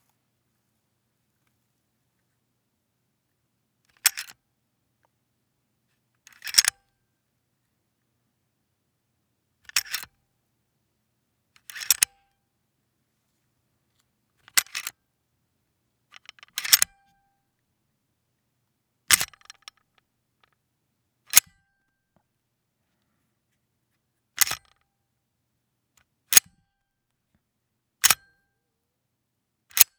Sound effects > Other mechanisms, engines, machines
Glock17 Slide
Racking and releasing a Glock 17's slide. GLOCK 17 SLIDE